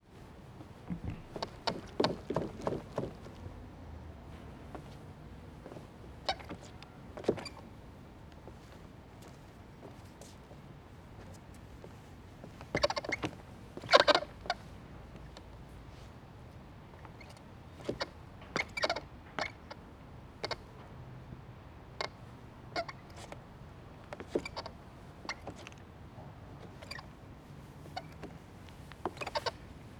Sound effects > Natural elements and explosions
WOODFric-XY Zoom H4e Creaks of planks SoAM Sound of Solid and Gaseous Pt 1 Construction site
There is one sound of my first test field recording Library "Sound of Solid and Gaseous Pt.1" with Zoom H4e and Contact mic by IO Audio. Wish it will be useful! Record_by_Sound_of_Any_Motion SoAM